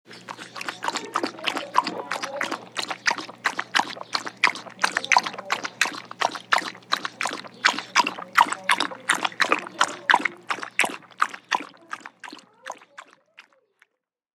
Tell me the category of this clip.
Soundscapes > Indoors